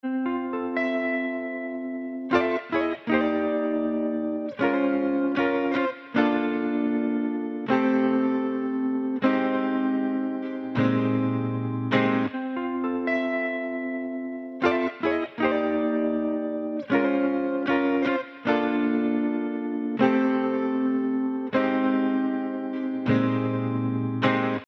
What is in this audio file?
Music > Solo instrument
Guitar Loop - (F Major) (BPM = 78)
Plucked, Strings, Guitar